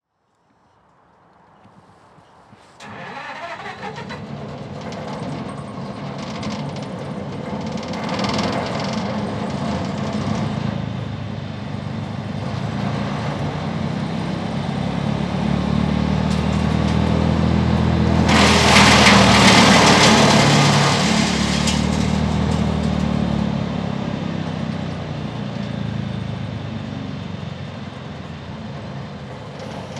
Sound effects > Other mechanisms, engines, machines
A branch being fed through a wood muncher after tree felling. Recorded on phone.